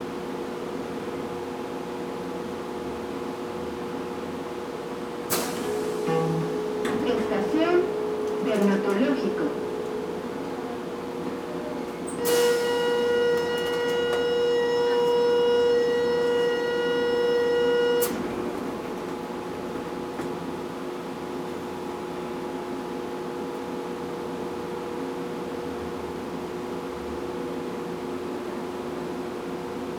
Soundscapes > Urban
Public address system announcing arrival to Dermatológico train station in Guadalajara, México.